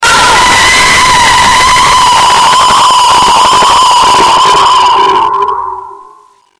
Sound effects > Electronic / Design
Loud Jumpscare
Audio, Loud, Sound